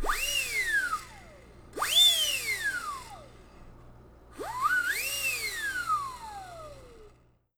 Sound effects > Objects / House appliances
A whoopee whistle sweep.